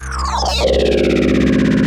Instrument samples > Synths / Electronic
CVLT BASS 29
bass, bassdrop, clear, drops, lfo, low, lowend, stabs, sub, subbass, subs, subwoofer, synth, synthbass, wavetable, wobble